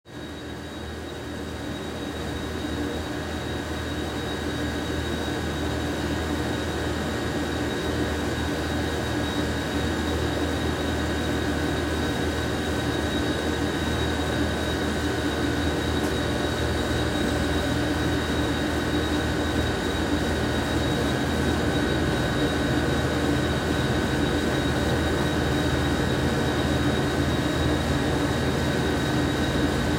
Sound effects > Other mechanisms, engines, machines
Vending Machines in Hotel
Droning buzz of vending machines in a hotel vending area. Recorded in Cleveland, Ohio.
buzz, hotel, machine, mechanical, motor, vending